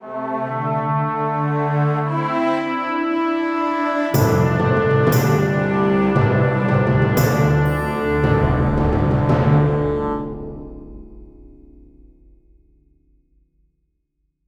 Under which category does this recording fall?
Music > Multiple instruments